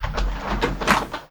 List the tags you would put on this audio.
Sound effects > Objects / House appliances
window modern opening

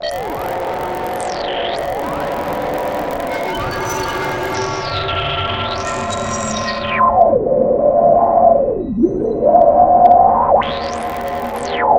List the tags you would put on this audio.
Sound effects > Electronic / Design
cinematic content-creator dark-design dark-soundscapes dark-techno drowning horror mystery noise noise-ambient PPG-Wave science-fiction sci-fi scifi sound-design vst

